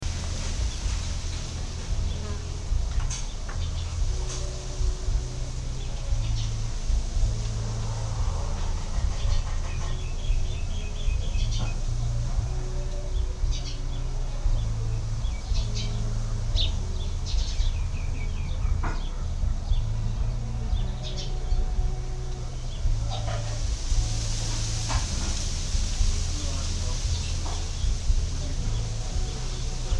Soundscapes > Urban
Suburban Backyard with Nearby Music
Backyard in the suburbs with neighbors playing music with heavy base. Birds and people moving around the back entrance of the house.
Street, People